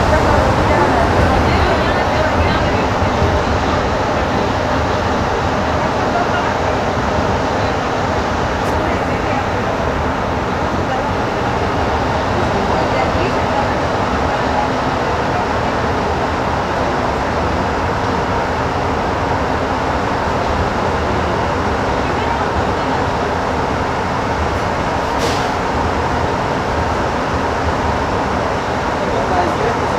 Sound effects > Other mechanisms, engines, machines
room tone metro mexico city